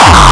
Instrument samples > Percussion
Hardcore Kick 1
Retouched multiple kicks in FLstudio original sample pack. Processed with ZL EQ, Waveshaper, Vocodex, Khs phaser.
hardcore, oldschool